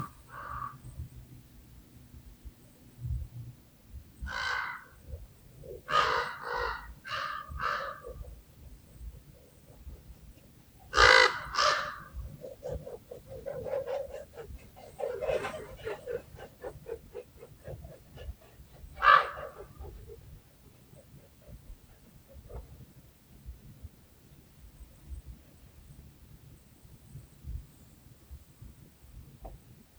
Soundscapes > Nature
Ravens in Redwoods Forest, Caws, Wings Batting, Spectral Isolated
Ravens flying in the Redwoods of Humboldt County, California. Cleaned up and honed in on the sounds using Izotope RX and Reaper.
atmosphere, soundscape, birdsong, wildlife, birdsounds, field-recording, spring, ravens, ambience, caws, fall, birds, redwoods, forest, echo, animal-sounds, raven, natural, echos, animal, wings, flying, caw, flutter, tweet, bird, nature, animals, ambient, woods